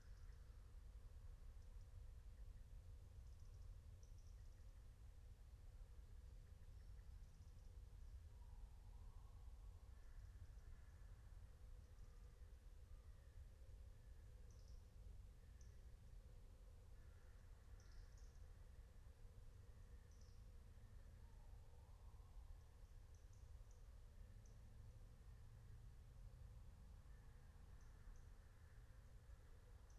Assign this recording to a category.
Soundscapes > Nature